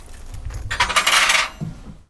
Sound effects > Objects / House appliances
Junkyard Foley and FX Percs (Metal, Clanks, Scrapes, Bangs, Scrap, and Machines) 42
Ambience, Atmosphere, Bang, Bash, Clang, Clank, Dump, dumping, Environment, Foley, FX, garbage, Junk, Junkyard, Machine, Metal, Metallic, Perc, rattle, Robot, rubbish, SFX, Smash, waste